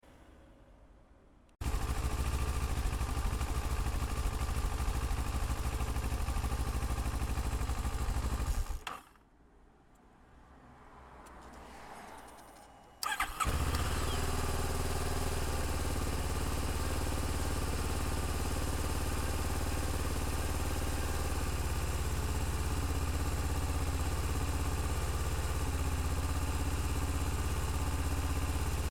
Sound effects > Vehicles
Kawasaki Versys 650cc '07 - Engine Work (Start / Stop)
Recorded on TASCAM - DR-05X. My bike - Kawasaki Versys 650 cc (no ABS version) - 2007.
engine, kawasaki, motor, motorbike, motorcycle, rev, versys